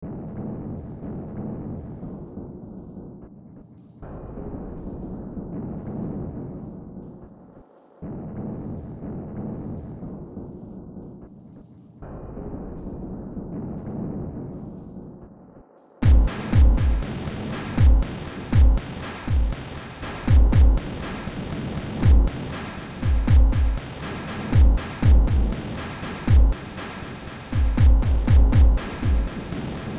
Music > Multiple instruments
Demo Track #3348 (Industraumatic)
Ambient, Soundtrack, Industrial, Cyberpunk, Sci-fi, Underground, Noise, Games, Horror